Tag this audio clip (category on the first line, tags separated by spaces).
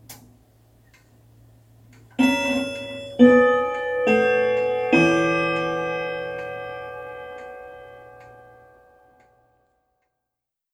Sound effects > Other mechanisms, engines, machines
Phone-recording first-quarter grandfather-clock first clock grandfather quarter chime